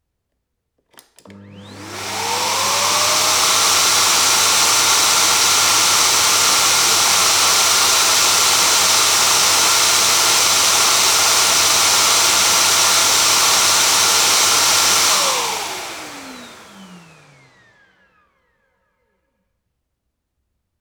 Sound effects > Objects / House appliances
250726 - Vacuum cleaner - Philips PowerPro 7000 series - Vacuum cleaner 1m away highest setting (focus on all elements)
7000, aspirateur, cleaner, FR-AV2, Hypercardioid, MKE-600, MKE600, Powerpro, Powerpro-7000-series, Sennheiser, Shotgun-mic, Shotgun-microphone, Single-mic-mono, Tascam, Vacum, vacuum, vacuum-cleaner